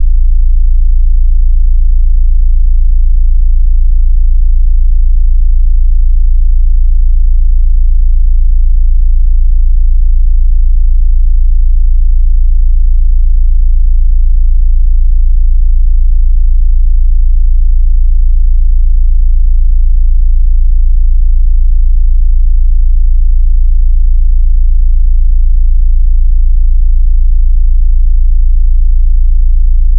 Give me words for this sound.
Sound effects > Electronic / Design
56 Hz - 28 Hz bassier
low, hum, basshum, 56-Hz, 56Hz, sinusoid, bassthrob, foundation, 28-Hz, superbass, sinewave